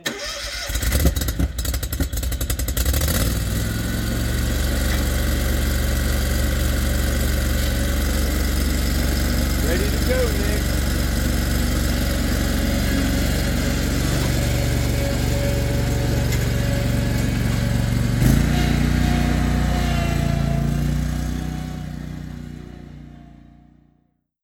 Sound effects > Other mechanisms, engines, machines
A Scag V-Ride III Fuel Injected lawnmower starting and driving away.